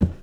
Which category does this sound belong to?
Sound effects > Objects / House appliances